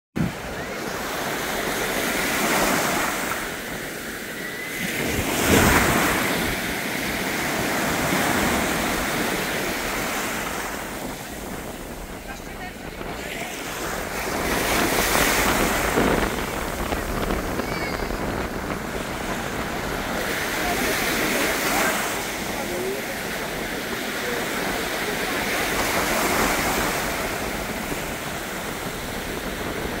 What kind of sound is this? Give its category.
Soundscapes > Nature